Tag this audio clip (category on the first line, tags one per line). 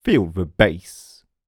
Speech > Solo speech
bass
bass-word
chant
dry
feel
FR-AV2
hype
Male
Man
Mid-20s
Neumann
oneshot
raw
singletake
Single-take
Tascam
U67
un-edited
Vocal
voice